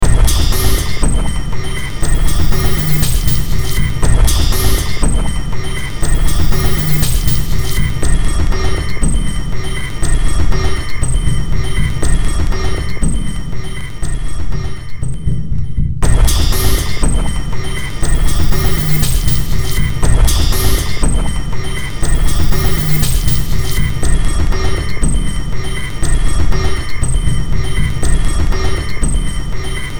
Music > Multiple instruments
Demo Track #3994 (Industraumatic)
Cyberpunk, Industrial, Games, Noise, Soundtrack, Sci-fi, Ambient, Horror, Underground